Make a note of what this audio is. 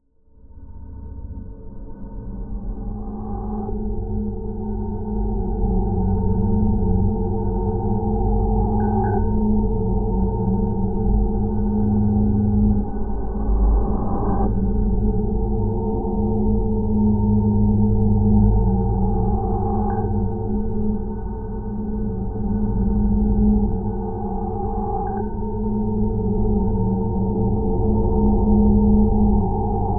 Music > Other
Descending Stairs (Horror Texture) 2
halloween, lux-aeterna-audio, horror-atmosphere, spooky-atmosphere, sinister-vibes, horror-drone, scary-atmosphere, ominous-drone, scary, horror-texture, scary-texture, spooky-texture, unsettling-drone, spooky-drone, ominous-tone, chilling-drone, chilling-tone, scary-drone, scary-tone